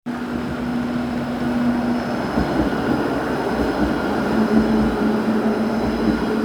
Soundscapes > Urban
voice 30-11-2025 1 tram
Rattikka, Tram, TramInTampere